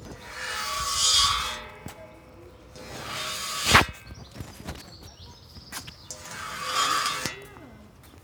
Sound effects > Natural elements and explosions
250609 11h17 Albi Madeleine Bd Strasbourg - Fingernail handrailing
Subject : Recorded for Dare2025-09 Friction-Metal Date YMD : 2025 06 09 (Monday) 11h17 Location : Albi madeleine 81000 Tarn Occitanie France. Outdoors Hardware : Tascam FR-AV2, Soundman OKM1 One side held next to the railing. Weather : Clear sky 24°c ish, little to no wind. Processing : Trimmed in Audacity. Probably a 80hz 36db per octave HPF applied. (Check metadata) And used a single side of the capture to make it mono. Notes : That day, there was a triathlon going on. Also a few more people/noise around that I would like to record such a foley sound, but something is better than nothing?
2025, 81000, Albi, City, cringe, Dare2025-09, Dare2025-Friction, experimental, finger-nail, France, FR-AV2, friction, june, metal, monday, mono, Occitanie, OKM, OKM-1, OKM1, Outdoor, scratching, single-microphone-mono, Soundman, Tarn, Tascam, weird